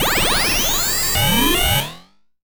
Sound effects > Experimental
Analog Bass, Sweeps, and FX-154
alien, analog, analogue, bass, basses, bassy, complex, dark, effect, electro, electronic, fx, korg, machine, mechanical, oneshot, pad, retro, robot, robotic, sample, sci-fi, scifi, sfx, snythesizer, sweep, synth, trippy, vintage, weird